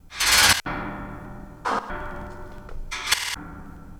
Sound effects > Electronic / Design
Industrial Estate 38
From a pack of samples created with my modular system and optimized for use in Ableton Live. The "Industrial Estate" loops make generous use of metal percussion, analog drum machines, 'micro-sound' techniques, tape manipulation / digital 'scrubbing', and RF signals. Ideal for recycling into abrasive or intense compositions across all genres of electronic music.
industrial, techno, loops, Ableton, 120bpm, soundtrack, chaos